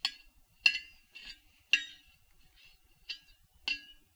Sound effects > Experimental
contact mic in metal thermos, empty hit
Hitting an empty giant thermos with a contact mic inside.
contact-mic, contact-microphone, experimental, thermos, water, water-bottle